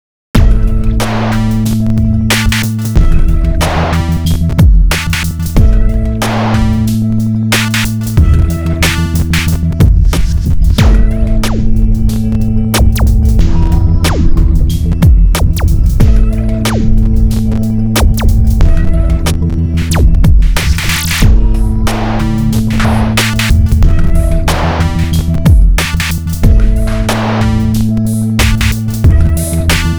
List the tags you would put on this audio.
Music > Multiple instruments
break
dubstep
synth

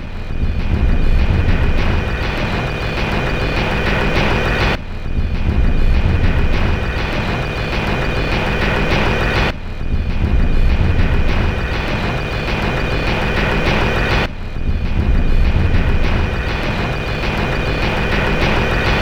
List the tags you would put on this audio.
Soundscapes > Synthetic / Artificial
Alien
Ambient
Dark
Drum
Industrial
Loop
Loopable
Packs
Samples
Soundtrack
Underground
Weird

